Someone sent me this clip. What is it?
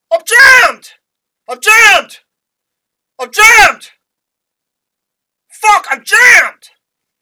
Speech > Solo speech
Soldier Jammed Multiple takes
Multiple Takes of saying Jammed weapon
army, attack, fight, military, war